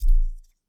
Sound effects > Objects / House appliances
Tying a satin ribbon , recorded with a AKG C414 XLII microphone.